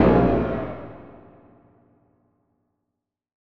Sound effects > Electronic / Design
RATTLING SUBMERGED HIT
BASSY, BOLHA, BOOM, BRASIL, BRASILEIRO, BRAZIL, BRAZILIAN, DEEP, EXPLOSION, FUNK, HIT, IMPACT, LOW, MANDELAO, PROIBIDAO, RATTLING, RUMBLING